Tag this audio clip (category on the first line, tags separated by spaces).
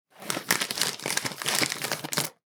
Sound effects > Other
postproduction texture crunchy SFX plastic